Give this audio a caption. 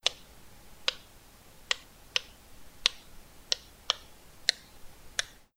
Sound effects > Objects / House appliances
TOYMisc-Blue Snowball Microphone, MCU Fidget Popper Nicholas Judy TDC
A fidget popper.